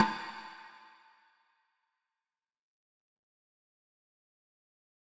Music > Solo percussion
Snare Processed - Oneshot 235 - 14 by 6.5 inch Brass Ludwig
acoustic, crack, drum, drumkit, drums, flam, fx, hit, hits, kit, ludwig, oneshot, perc, processed, realdrum, realdrums, reverb, rim, rimshot, rimshots, roll, sfx, snare, snaredrum, snareroll, snares